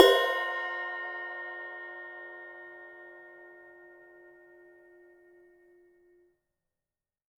Music > Solo instrument
Zildjian 16 inch Crash-009
16inch, Crash, Custom, Cymbal, Cymbals, Drum, Drums, Kit, Metal, Oneshot, Perc, Percussion, Zildjian